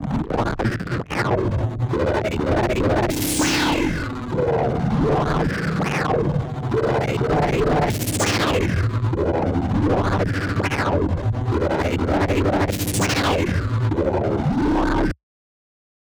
Sound effects > Electronic / Design
Optical Theremin 6 Osc Shaper Infiltrated-031
Alien, Analog, Chaotic, Crazy, DIY, EDM, Electro, Electronic, Experimental, FX, Gliltch, IDM, Impulse, Loopable, Machine, Mechanical, Noise, Oscillator, Otherworldly, Pulse, Robot, Robotic, Saw, SFX, strange, Synth, Theremin, Tone, Weird